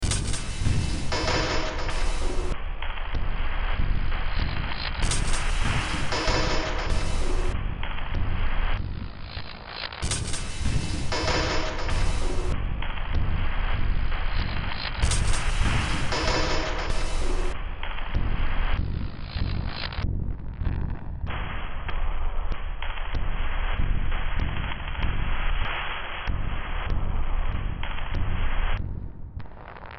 Music > Multiple instruments
Demo Track #3367 (Industraumatic)
Ambient, Cyberpunk, Games, Industrial, Sci-fi, Soundtrack, Underground